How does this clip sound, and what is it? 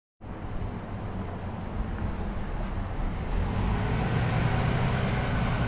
Sound effects > Vehicles
A bus passing by. The sound was recorded at Hervanta (Tampere, Finland). The sound was recorded using Google pixel 6a microphone. No extra gear was used and no editing was performed. The sound was recorded for further classification model development, with a goal to classify vehichles by sound.